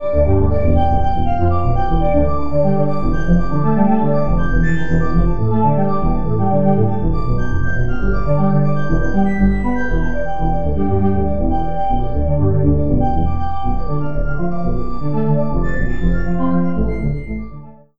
Music > Solo instrument
strange industry - 120 bpm
A busy combination of synth bells, strings, and flutters that creates an impression of a cartoon factory or a Rube Goldberg machine in action. Made with Ableton Live and my MIDI keyboard.
music, electronic, rhythmic, ambient